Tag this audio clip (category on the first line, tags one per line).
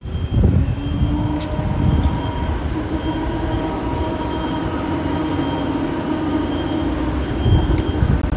Sound effects > Vehicles
field-recording
tram
Tampere